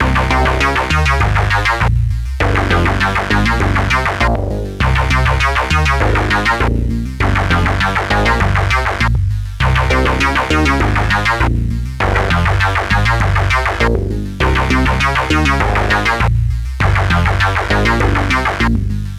Music > Solo instrument
100 D# Polivoks Brute 06
Electronic, Brute, Casio, Melody, Synth, Analog, Texture, Soviet, Vintage, Polivoks, Analogue, Loop, 80s